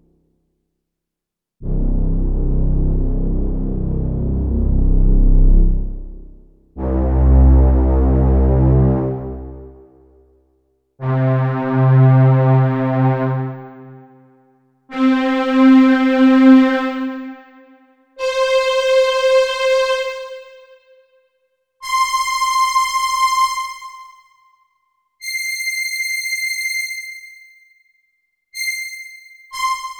Instrument samples > Piano / Keyboard instruments
Yamaha E-Piano strings
Yamaha electric piano, misc string sounds.